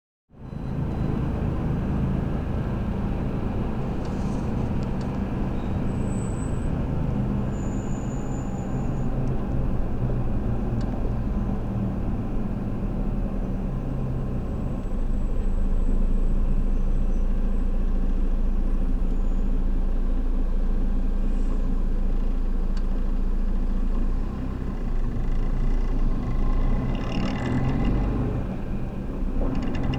Sound effects > Vehicles
Ride in an old bus with a pickup microphone
1. Microphone attached to the window 2. Microphone attached to the side wall 3. Microphone attached to the floor Gear: - Tascam DR100 Mk3 - AKG C411 pickup microphone
Ride, Vehicles, Bus, AKGC411